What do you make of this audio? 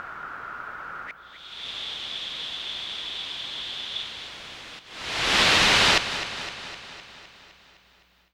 Sound effects > Experimental
Analog Bass, Sweeps, and FX-071
sci-fi, bass, retro, bassy, sfx, electro, robotic, complex, basses, trippy, vintage, dark, alien, effect, analogue, electronic, mechanical, machine, pad, sweep, synth, robot, oneshot, fx, sample, weird, analog, snythesizer, korg, scifi